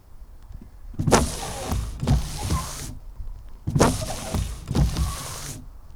Sound effects > Vehicles
Ford 115 T350 - Windscreen wiper (further back)
2025, Tascam, Single-mic-mono, FR-AV2, August, 115, Van, Old, 2003-model, Vehicle, 2003, T350, SM57, Ford, A2WS, Ford-Transit, Mono, France